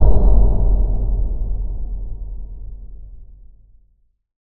Sound effects > Electronic / Design
LASTING DEEP METALLIC BOOM
HIT, BRAZIL, MANDELAO, PROIBIDAO, BRAZILIAN, IMPACT, RUMBLING, DEEP, BOLHA, BASSY, EXPLOSION, BOOM, BRASILEIRO, LOW, BRASIL, RATTLING, FUNK